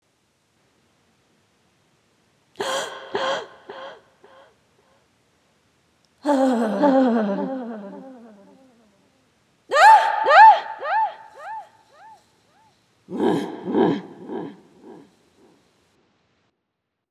Sound effects > Human sounds and actions
Woman Dying With Echo Reverb
This came from a video game project I was working on a few years ago that never came to fruition. It was a Metal Gear Solid-inspired stealth game, and I had an actress friend record some sound effects for me. In this clip are four different takes of her "dying": a gasp, a death rattle, a cry, and a grunt. All of them contain a little echo or reverb. I hope you can use them for something cool!
american, death, dramatic, dying, echo, female, game, reverb, videogame, vocal, woman